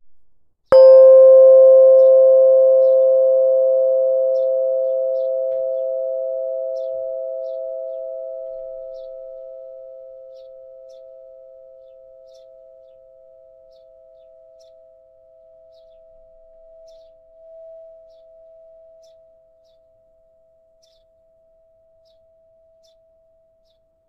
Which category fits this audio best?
Instrument samples > Percussion